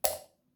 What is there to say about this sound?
Sound effects > Objects / House appliances
Switch on 2
Simple sound effect of me pressing my bedroom light switch, it has a little echo in the background but can be removed by using an audacity plugins.